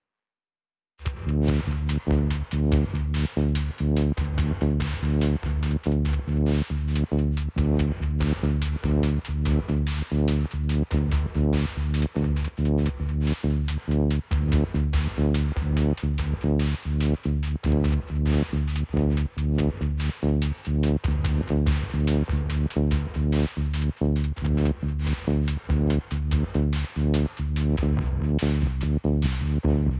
Music > Multiple instruments
clockwise works- BUMP 4
beat, casio, dance, electro, electro-organic, groovy, juno-106, lo-fi, loop, minimal, perc, rhythm, rubbish